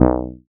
Instrument samples > Synths / Electronic
MEOWBASS 2 Eb
additive-synthesis, fm-synthesis, bass